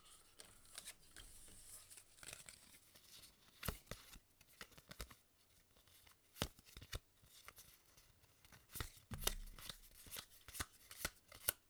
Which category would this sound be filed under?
Sound effects > Other